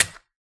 Sound effects > Objects / House appliances
Matchsticks Strike 3 Hit
Shaking a matchstick box, recorded with an AKG C414 XLII microphone.
fire, matchstick